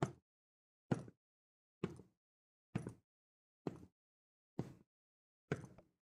Sound effects > Human sounds and actions
Pretty generic footsteps recorded with boots over a tile floor. They were intended for a video game so the uploaded file is already edited in 10 separate variations. Gear: Zoom H4n Sennheiser MKH 50